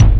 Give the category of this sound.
Instrument samples > Percussion